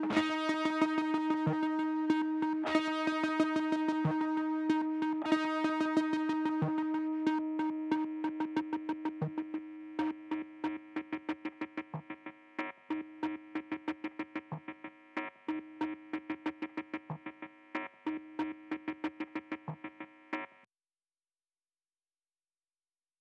Music > Other
guitar slicer loop in Eb
guitar, loop, glitch